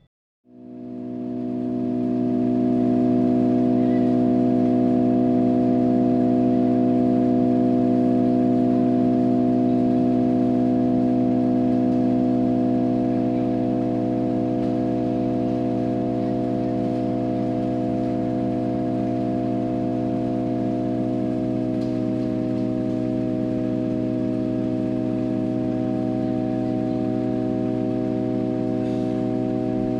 Sound effects > Other mechanisms, engines, machines
Various machines, mechanisms, motors and devices, exploratorium recording project zoom F3/immersive soundscapes ORTF